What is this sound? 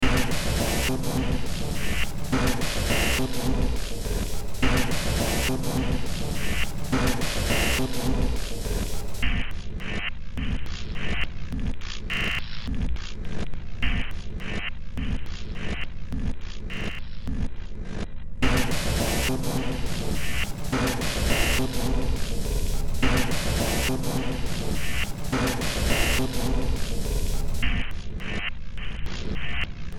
Music > Multiple instruments
Demo Track #3484 (Industraumatic)
Ambient, Games, Horror